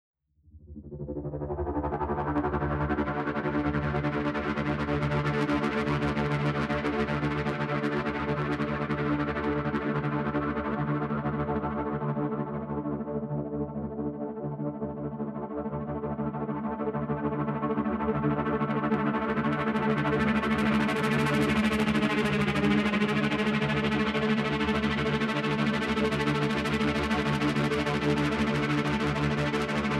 Sound effects > Electronic / Design
Creepy pad
This ambient pad sound was created and processed in DAW; Creepy feeling of this pad can be used in horror scenes or something like that, have fun with it. Ы.